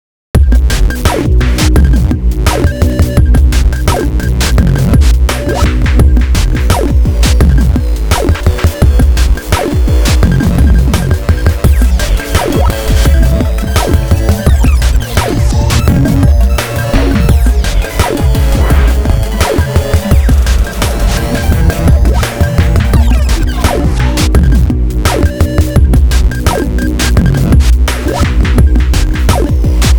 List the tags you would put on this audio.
Music > Multiple instruments
drumloop edm glitchy hip hop idm industrial loops melodies melody new patterns percussion

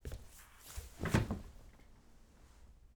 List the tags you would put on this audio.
Sound effects > Objects / House appliances
falling furniture home human movement sofa

